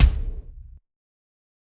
Instrument samples > Percussion
Real recorded one shot sample of a 18x24 DW Performance Series Kick Drum!